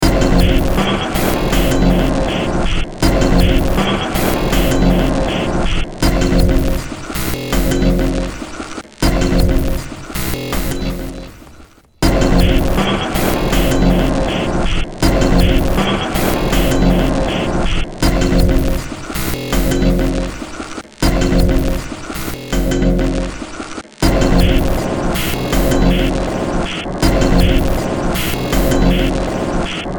Music > Multiple instruments
Short Track #3015 (Industraumatic)
Soundtrack, Sci-fi, Industrial, Ambient, Cyberpunk, Horror, Noise, Games, Underground